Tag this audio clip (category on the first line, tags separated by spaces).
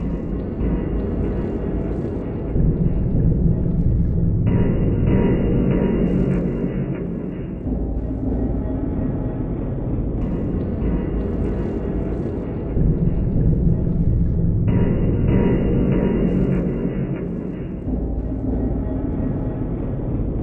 Instrument samples > Percussion
Industrial Underground Packs Dark Samples Ambient Weird Alien Drum Soundtrack Loopable Loop